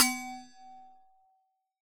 Sound effects > Objects / House appliances
Resonant coffee thermos-017
sampling, recording, percusive